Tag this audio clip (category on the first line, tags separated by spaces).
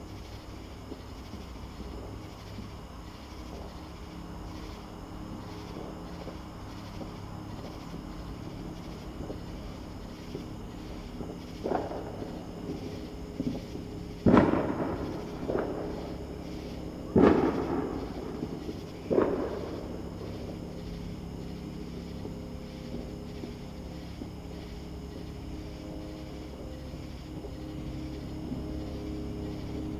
Sound effects > Other
america day electronic experimental explosions fireworks fireworks-samples free-samples independence patriotic sample-packs samples sfx United-States